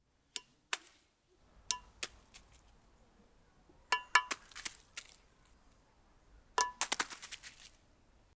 Sound effects > Natural elements and explosions
falling cone metal
Falling cone to the metal barrier
cone, falling, forest, metal